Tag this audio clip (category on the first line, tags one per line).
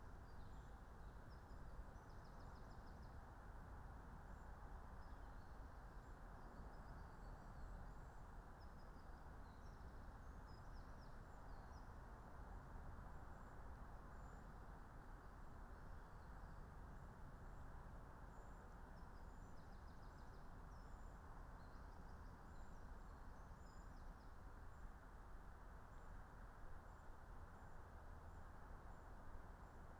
Soundscapes > Nature
meadow
nature
natural-soundscape
raspberry-pi
phenological-recording
soundscape
alice-holt-forest
field-recording